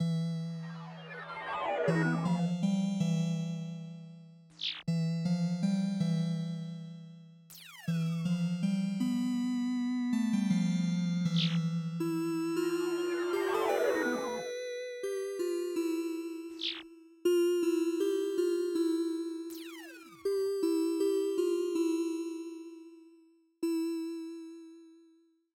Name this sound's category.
Music > Multiple instruments